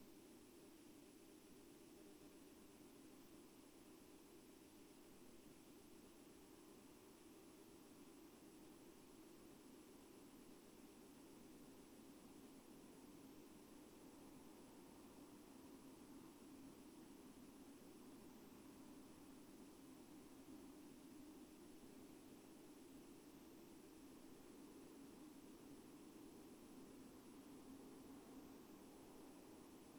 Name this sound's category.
Soundscapes > Nature